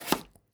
Sound effects > Other
Quick vegetable chop 15
Potato being quickly chopped with a Santoku knife in a small kitchen.
Chop, Cooking, Chef, Chief, Cook, Vegetable, Home, Quick, Kitchen, Knife, Slice